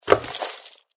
Sound effects > Electronic / Design
MobileUI Coins
SFX for an interface for a coin / treasure chest affirmation click. Perfect for indie / mobile games.
chest, click, coin, coins, collect, game, game-development, item, menu, menu-click, pick-up, select, Treasure, ui